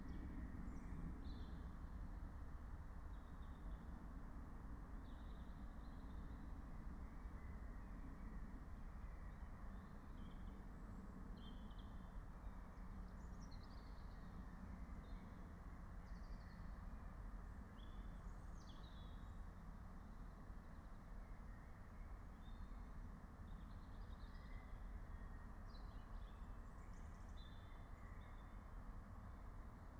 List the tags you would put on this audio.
Soundscapes > Nature
natural-soundscape
phenological-recording
soundscape

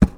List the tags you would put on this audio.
Sound effects > Objects / House appliances
clang
fill

